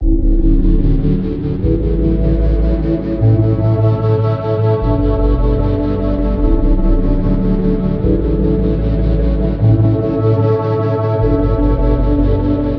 Soundscapes > Synthetic / Artificial
Deep ambient song intro 150bpm
ambience, atmosphere, electro, electronic, loop, music, processed, synth